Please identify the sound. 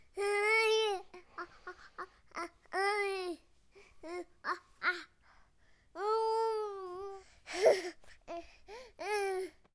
Sound effects > Human sounds and actions
Child Talk V2 1 Year Old Liam Talking Happy + Unhappy Humdrum
Recording of 1 year old sad and happy version 2
cry, baby, child, infant, human